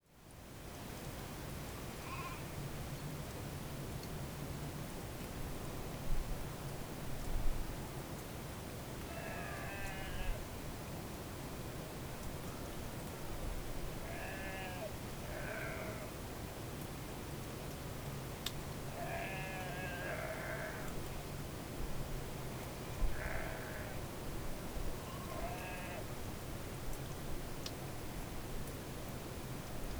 Soundscapes > Nature
Countryside atmos sheep bleating in the distance, birds
Countryside atmosphere, distant sheep bleating, and some birds as well. An occasional raindrop can be heard falling. Recorder used: ZOOM H2essential.
day, calm, birds, atmos, countryside, bleating, ambience, sheep